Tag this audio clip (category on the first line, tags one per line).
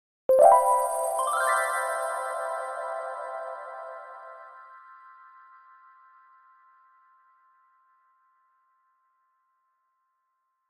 Sound effects > Other
Short
MIDI
Sparkles
SFX
Sound
Magic
Effect